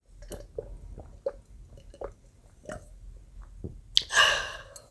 Sound effects > Human sounds and actions
Drinking potion
Drinking some water good to use for an RPG game perhaps. Made by R&B Sound Bites if you ever feel like crediting me ever for any of my sounds you use. Good to use for Indie game making or movie making. This will help me know what you like and what to work on. Get Creative!
refreshed, water